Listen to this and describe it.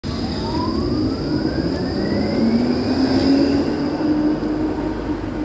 Sound effects > Vehicles
17tram toleavetown
A tram is leaving a stop and speeding up. Recorded in the city center of Tampere with a Samsung phone.
city, public-transport, traffic, tram